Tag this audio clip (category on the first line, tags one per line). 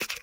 Sound effects > Other
game
interface
paper
rip
scrunch
tear
ui